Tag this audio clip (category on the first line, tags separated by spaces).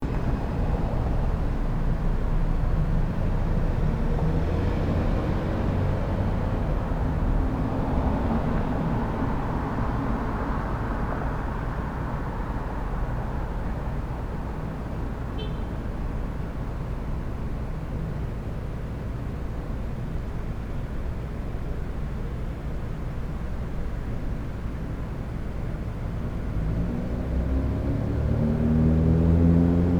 Soundscapes > Urban
cars city